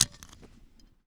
Sound effects > Vehicles
Ford 115 T350 - Seat-belt out
115, 2003, 2003-model, 2025, A2WS, August, Ford, Ford-Transit, France, FR-AV2, Mono, Old, Single-mic-mono, SM57, T350, Tascam, Van, Vehicle